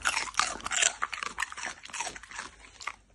Sound effects > Animals
Dog Munching Bell Pepper
Dog eats a slice of red bell pepper. iPhone 15 Pro recording extracted via Audacity 3.7.5.
bell-pepper, chew, chewing, chomp, chomping, crunch, crunchy, eat, eating, food, munch, munching, noisily, vegetable, vegetables